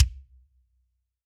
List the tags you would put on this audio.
Instrument samples > Other
drums
kickdrum
sample
trigger

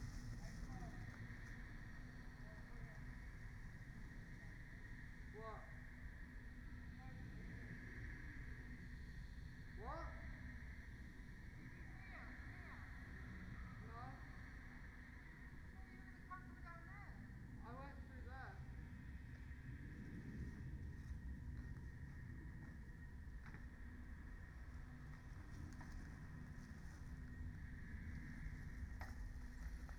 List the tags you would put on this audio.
Soundscapes > Nature
natural-soundscape
weather-data
artistic-intervention
field-recording
nature
phenological-recording
soundscape
alice-holt-forest
modified-soundscape
sound-installation
raspberry-pi
data-to-sound
Dendrophone

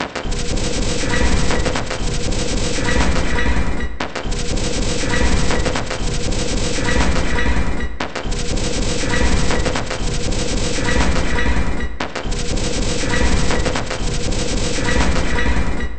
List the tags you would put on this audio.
Instrument samples > Percussion
Loopable
Weird
Ambient
Loop
Samples
Soundtrack
Packs
Drum
Underground
Industrial
Dark
Alien

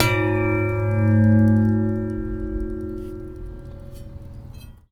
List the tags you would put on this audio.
Sound effects > Objects / House appliances
Ambience
Atmosphere
Bang
Bash
Clang
Clank
Dump
dumping
dumpster
Environment
Foley
FX
garbage
Junk
Junkyard
Machine
Metal
Metallic
Perc
Percussion
rattle
Robot
Robotic
rubbish
scrape
SFX
Smash
trash
tube
waste

